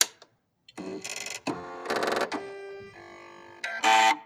Sound effects > Objects / House appliances
Computer Starting
The sound of a "smart" sewing machine starting, probably some sort of motor calibration thing. Sounds like a mid 1980s computer of some kind, or just a contraption-ish doohickey in general. Slight reverb and noise reduction added inside of audacity to make sound more roomy. Recorded on a Motorola (Lenovo) G Power 2024 5G
computer, disc, disk, drive, floppy, harddrive, hdd, industrial, machine, mechanical, motor, sewing, startup